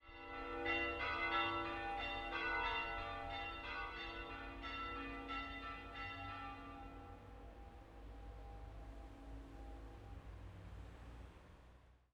Soundscapes > Urban
Celebratory Greek Orthodox church bells are ringing at a slow pace. Some phasing is audible due to distance and weather conditions.